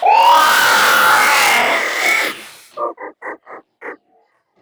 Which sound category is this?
Sound effects > Human sounds and actions